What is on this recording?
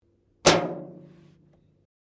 Sound effects > Objects / House appliances
It a can. It made a noice and I liked it. Recorded on a Macbook pro
Beercan, Macbook-Pro, nice, personal